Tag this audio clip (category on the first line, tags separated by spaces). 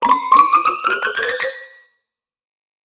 Sound effects > Electronic / Design
ui interface game